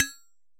Sound effects > Objects / House appliances
Empty coffee thermos-008
percusive, sampling, recording